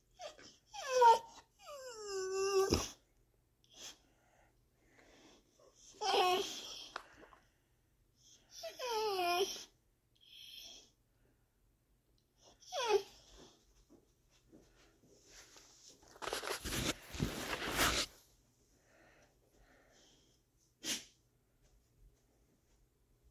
Sound effects > Animals
ANMLDog Dog Whimpering, Sneeze at End Nicholas Judy DC01
A dog whimpering, followed by a sneeze at the end. Performed by Jasper, the Judy family's dog.